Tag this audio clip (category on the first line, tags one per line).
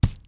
Sound effects > Experimental
punch
foley
bones
vegetable
thud
onion